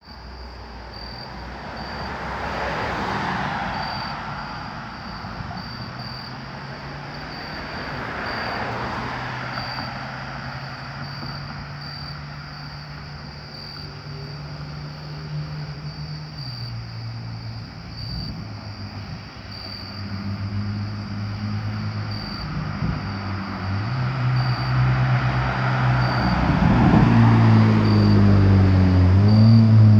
Soundscapes > Urban
Cars and crickets
This was recorded with an iPhone 14 Pro The sounds of the main street I live on. Cars rush by while the crickets chirp. Awesome
crickets, field-recording, nature, noise